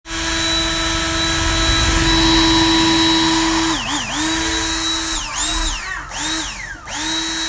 Sound effects > Other mechanisms, engines, machines
Máy Thỏi Không Khí - Air Blow Machine 2
Air blow machine. Record use iPhone 7 Plus smart phone 2026.01.20 16:35
air, blow, fast, machine